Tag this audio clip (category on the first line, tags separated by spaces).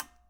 Sound effects > Objects / House appliances
bonk glass industrial natural fieldrecording hit metal foundobject stab object fx perc clunk oneshot sfx mechanical drill foley percussion